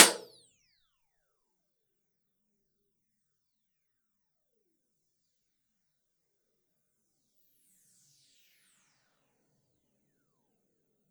Sound effects > Other
Upstairs bathroom impulse response
Impulse response of the upstairs bathroom in my house. Tiny, brick wall and tile floor.
bathroom, ir, reverb, reverberation